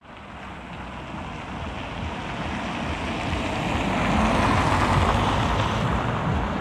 Soundscapes > Urban
Car driving by recorded in an urban area.